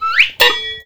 Sound effects > Other
TOONImpt-CU Slip And Fall 02 Nicholas Judy TDC
Slip and fall. A quick slide whistle up and honk-bang.
slide, up, slip, cartoon, bang, fall, whistle, quick, Blue-Snowball, slide-whistle, honk, Blue-brand